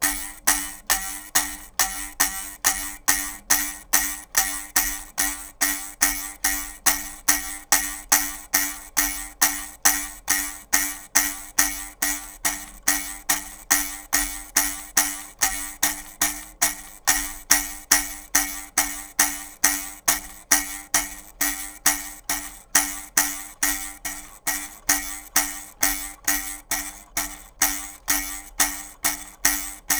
Sound effects > Other
TOONBoing-Blue Snowball Microphone, CU Large, Springy Bounces Nicholas Judy TDC
Large springy bounces.
Blue-brand, Blue-Snowball, bounce, cartoon, large, springy